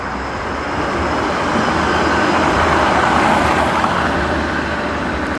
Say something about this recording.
Soundscapes > Urban
Electric tram operating on metal rails. High-pitched rail friction and metallic wheel screech, combined with steady electric motor hum. Rhythmic clacking over rail joints, bell or warning tone faintly audible. Reflections of sound from surrounding buildings, creating a resonant urban atmosphere. Recorded on a city street with embedded tram tracks. Recorded on iPhone 15 in Tampere. Recorded on iPhone 15 outdoors at a tram stop on a busy urban street. Used for study project purposes.
public, transportation, tram